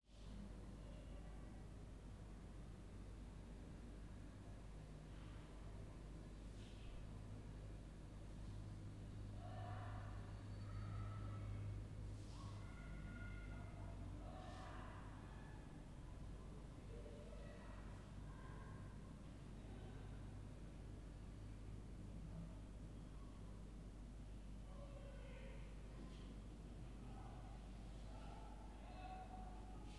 Soundscapes > Indoors
AMBRoom Residential building staircase room tone FK Local
Recording done in a residential building staircase done on a Sunday evening.
residential, staircase, stairway